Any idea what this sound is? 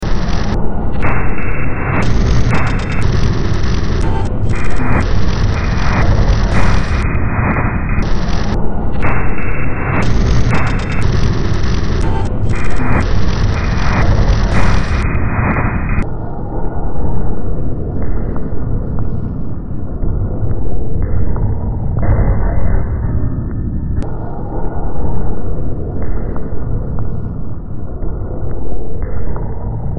Music > Multiple instruments
Demo Track #3016 (Industraumatic)
Ambient, Cyberpunk, Games, Horror, Industrial, Noise, Sci-fi, Soundtrack, Underground